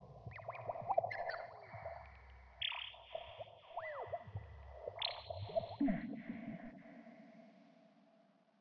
Soundscapes > Synthetic / Artificial
LFO Birsdsong 83
LFO, massive, Birsdsong